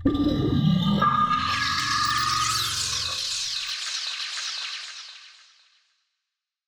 Sound effects > Experimental
Creature Monster Alien Vocal FX-3
Alien, Animal, boss, Creature, Deep, demon, devil, Echo, evil, Fantasy, Frightening, fx, gamedesign, Groan, Growl, gutteral, Monster, Monstrous, Ominous, Otherworldly, Reverberating, scary, sfx, Snarl, Snarling, Sound, Sounddesign, visceral, Vocal, Vox